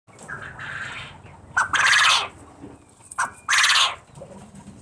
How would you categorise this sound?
Sound effects > Animals